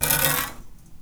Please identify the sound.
Sound effects > Other mechanisms, engines, machines
Handsaw Tooth Teeth Metal Foley 12
foley, fx, handsaw, hit, household, metal, metallic, perc, percussion, plank, saw, sfx, shop, smack, tool, twang, twangy, vibe, vibration